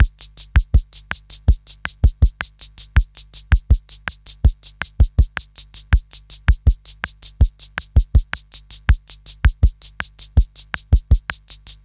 Instrument samples > Percussion
81 Welson Loop 01
Loops and one-shots made using Welson Super-Matic Drum Machine
Rare, 81bpm, Vintage, Synth, Drums, Electro, Electronic, Retro, DrumMachine, Hi-Hats, Loop, DrumLoop